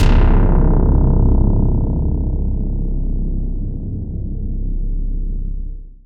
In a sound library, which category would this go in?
Instrument samples > Synths / Electronic